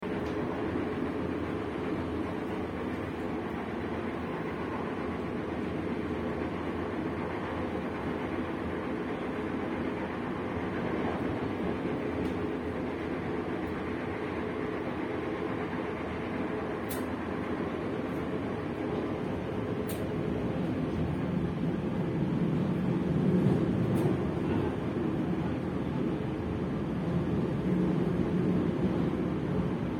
Soundscapes > Urban
Train On Board
Inside of a moving train (Hungary)